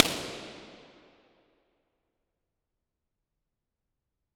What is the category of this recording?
Soundscapes > Other